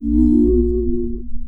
Sound effects > Human sounds and actions
Harmonic Humming
Foliage, Harmony, Humming, Voice